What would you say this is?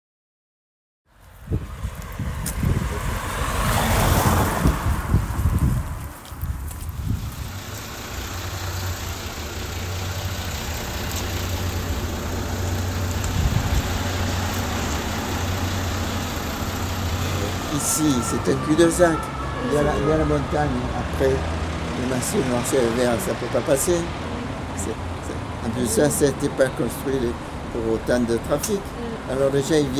Soundscapes > Nature
capsule sonore Madrague2
Ambient song of Madrague